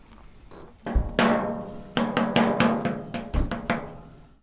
Sound effects > Objects / House appliances
Junkyard Foley and FX Percs (Metal, Clanks, Scrapes, Bangs, Scrap, and Machines) 97
rubbish, Clank, FX, dumping, Perc, Ambience, Junk, Metallic, Smash, Robotic, Metal, Clang, Atmosphere, Environment, Percussion, trash, Dump, dumpster, Robot, Bang, garbage, Bash, Junkyard, Foley, Machine, rattle, scrape, SFX, tube, waste